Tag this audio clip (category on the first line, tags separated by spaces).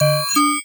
Sound effects > Electronic / Design
alert button Digital interface menu UI